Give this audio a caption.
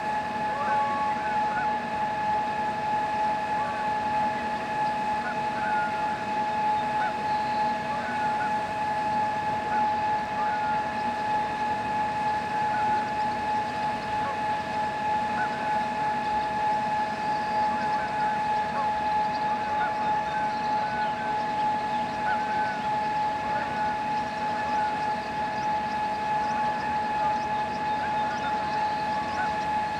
Soundscapes > Nature
Above a hydroelectric dam, mostly the whirr of the generator, but also some geese, swallows, and robins. Occasionally a vehicle goes by and enters a gage.